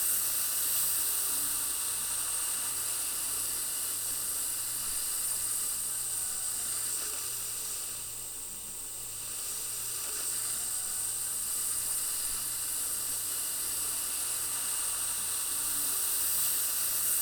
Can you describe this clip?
Sound effects > Human sounds and actions
Air, steady aerosol hiss. Human imitation. Looped. Comical.